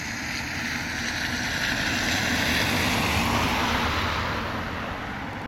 Soundscapes > Urban
auto7 copy
car, traffic, vehicle